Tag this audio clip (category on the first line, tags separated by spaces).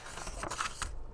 Sound effects > Objects / House appliances
Blue-brand Blue-Snowball book foley page turn